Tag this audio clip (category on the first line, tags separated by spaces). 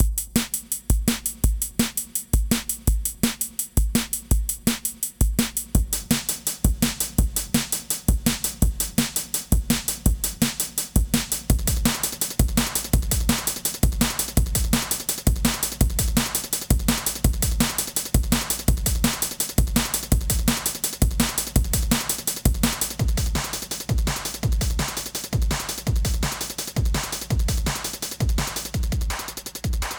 Music > Other
167 Breakbeat Breakcore Dance Dnb Drumandbass Drums Drumstep Edm Jungle Loop Neurofunk Percussion